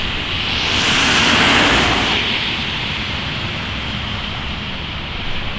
Sound effects > Vehicles
Car00059024CarSinglePassing
Sound recording of a single car driving down a road. The recording was made on a rainy, winter day. The segment of the road the recording was made at was in an urban environment without crosswalks or streetlights. Recorded at Tampere, Hervanta. The recording was done using the Rode VideoMic.
drive, car, automobile, field-recording, rainy, vehicle